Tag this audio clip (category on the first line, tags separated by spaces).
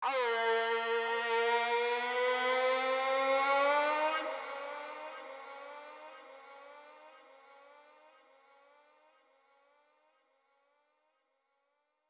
Speech > Other
raw human male